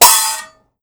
Music > Solo percussion
A 6'' hand cymbal choke.